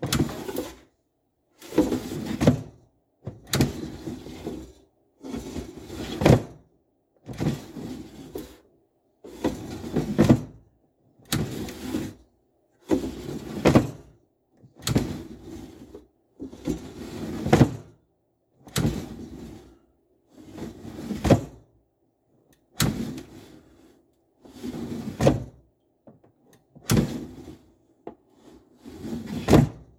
Sound effects > Objects / House appliances
DOORAppl-Samsung Galaxy Smartphone Air Fryer, Tray, Sliding Open, Close Nicholas Judy TDC
An air fryer tray sliding open and closed.
open, air-fryer, Phone-recording, tray, foley, close, slide